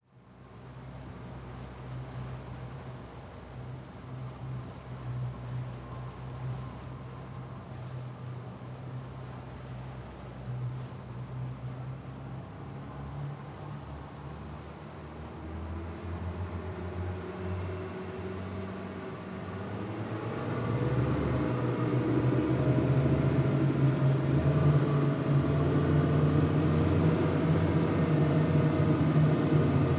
Sound effects > Vehicles
zodiac engine 2*300cv
300cv, boat, motor, water, zodiac